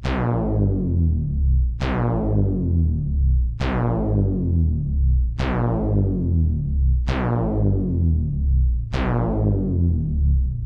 Instrument samples > Synths / Electronic
a little recreation of a soft hoover sound on the casio CZ1000